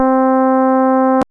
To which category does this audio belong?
Instrument samples > Synths / Electronic